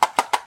Sound effects > Objects / House appliances
Gõ Thao Nhựa - Hit Plastic
Ky Duyên hit plastic in kitchen. Record use iPad 2 2025.07.26 09:45
plastic, hit